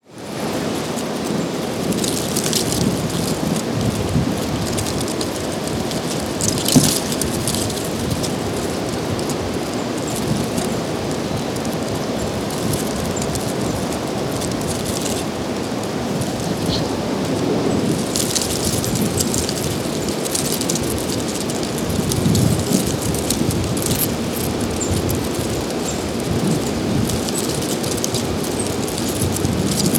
Soundscapes > Nature
Tall Grass Brushing Together in the Wind with Small Waterfall master
A close up (asmr) recording of tall grass brushing against itself in a gentle breeze. The background wash noise is from a small waterfall about 200 ft behind the grass. Recorded using Sennheiser shotgun mic Zoom F3 recorder
ambient, asmr, brush, brushing, field-recording, grass, ground, nature, outdoors, sound-design, water, waterfall, wind